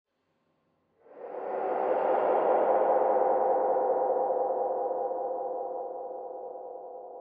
Sound effects > Experimental
Haunting Echoing Whoosh
Edited sound of me making a haunting howl and then edited it to sound inhuman.
haunting, processed, scary, woosh